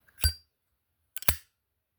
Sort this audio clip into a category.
Sound effects > Objects / House appliances